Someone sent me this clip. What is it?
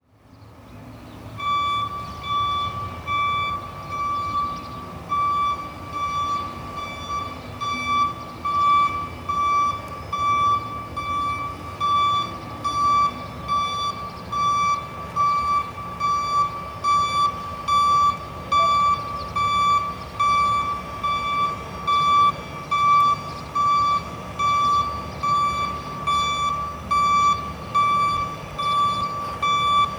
Sound effects > Other mechanisms, engines, machines
Cosntruction site truck reversing
The unmistakable beep of a heavy truck reversing, echoing through the bustling energy of a construction site
heavy, site, construction, reversing, truck